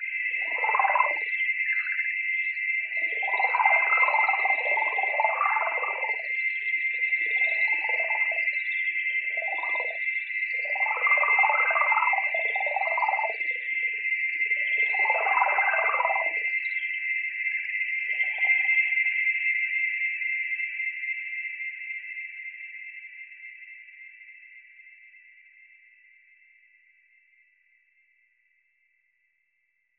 Soundscapes > Synthetic / Artificial
A short soundscape experimenting with ethereal resonances combined with granular botanical modulation.